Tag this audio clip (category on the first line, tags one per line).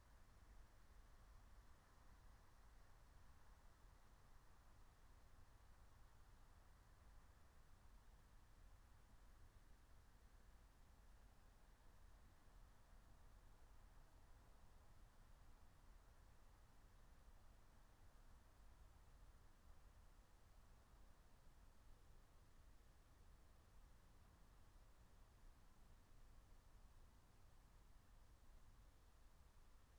Soundscapes > Nature
natural-soundscape
raspberry-pi
phenological-recording
field-recording
meadow
soundscape
alice-holt-forest
nature